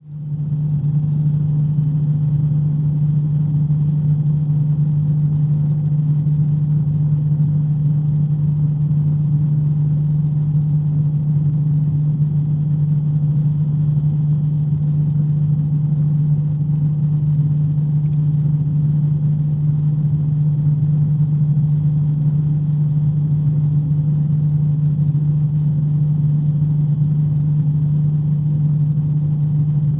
Soundscapes > Other
A recording of an air conditioning unit from outside, Close up.
air, conditioning, hum, machine, mechanical, noise, outdoor